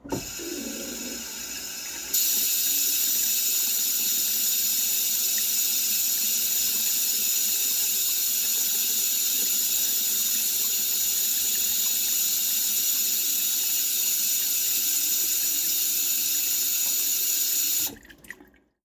Sound effects > Objects / House appliances
A footpedal sink turning on, running and turning off. Recorded at the Richmond VA Medical Center.